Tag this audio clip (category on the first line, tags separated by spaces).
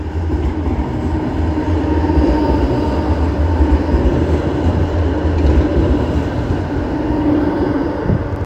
Sound effects > Vehicles
field-recording tram Tampere